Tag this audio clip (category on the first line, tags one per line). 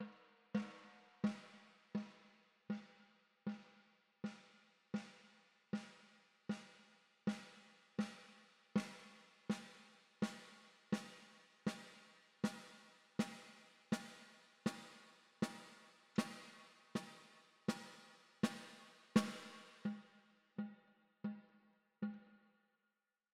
Music > Solo percussion
rimshot
snare
roll
brass
rim
oneshot
percussion
fx
snareroll
sfx
hit
perc